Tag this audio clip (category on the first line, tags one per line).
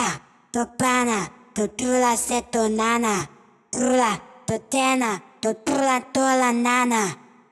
Music > Other
Acapella
Brazil
BrazilFunk